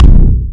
Instrument samples > Percussion
robowhoosh electrobass 4
It starts with my Tama kick/bass drum attack (see folders: kicks, triggers).